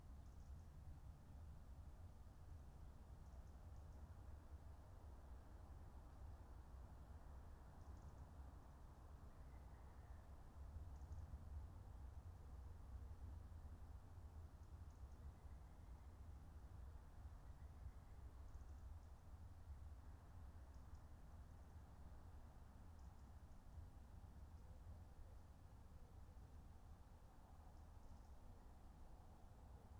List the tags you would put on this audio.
Soundscapes > Nature
Dendrophone sound-installation phenological-recording artistic-intervention soundscape field-recording raspberry-pi data-to-sound modified-soundscape weather-data nature alice-holt-forest natural-soundscape

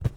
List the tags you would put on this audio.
Sound effects > Objects / House appliances
foley liquid pail pour tip water

